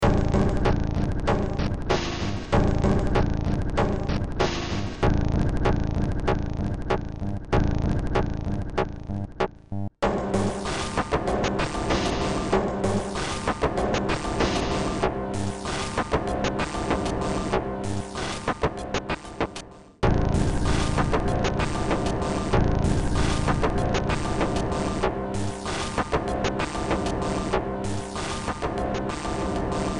Music > Multiple instruments
Short Track #3885 (Industraumatic)
Ambient, Games, Horror, Noise, Sci-fi, Industrial, Soundtrack, Underground, Cyberpunk